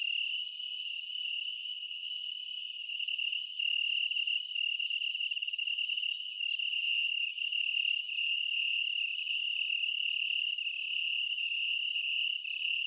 Sound effects > Animals
Loud chirping bugs
Cicadas? I have no idea. Recorded on my phone and then edited (mostly high passed) to get rid of background noise and make it loop, though it's not completely seamless.
insect, loop, bug